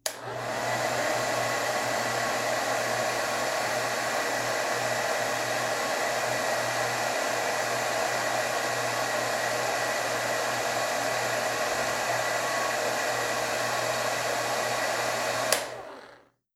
Sound effects > Objects / House appliances
MACHAppl-Samsung Galaxy Smartphone, CU Revlon Hair Dryer, On, Run at Low Speed, Off Nicholas Judy TDC
A revlon hair dryer turning on, running at low speed and turning off.